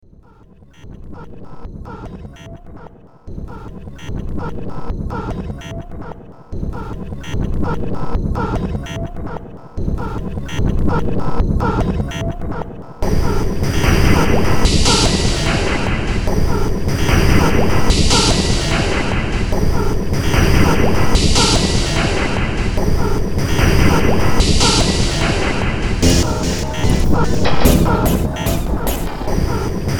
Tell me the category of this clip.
Music > Multiple instruments